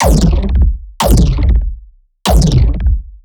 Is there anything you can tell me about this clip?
Sound effects > Other
08 - Weaponry - Ultra-Heavy Cannon B
Heavy cannon with a boomy low-end.
automatic, cannon, electronic, futuristic, railgun, rifle, sci-fi, scifi, semi-automatic, weapon